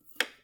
Sound effects > Other mechanisms, engines, machines
Dewalt 12 inch Chop Saw foley-039
SFX, Shop, Tools, Scrape, Percussion, FX